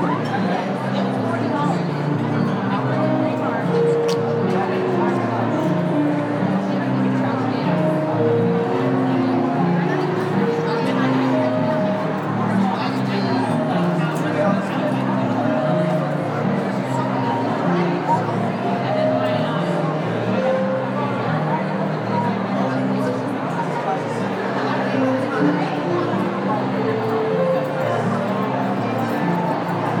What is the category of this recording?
Soundscapes > Indoors